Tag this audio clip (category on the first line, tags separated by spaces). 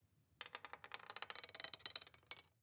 Sound effects > Other mechanisms, engines, machines
noise chain garage sample